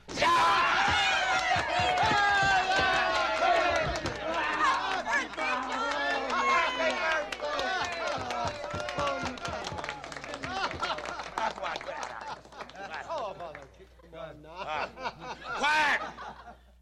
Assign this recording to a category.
Sound effects > Human sounds and actions